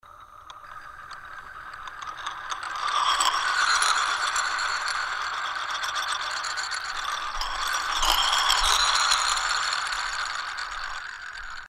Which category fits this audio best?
Sound effects > Other